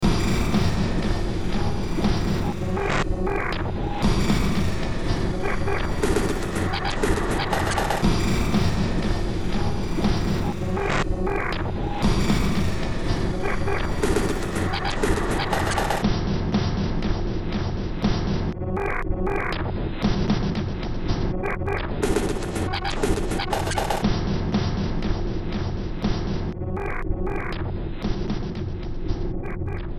Music > Multiple instruments
Demo Track #3504 (Industraumatic)
Cyberpunk, Horror, Noise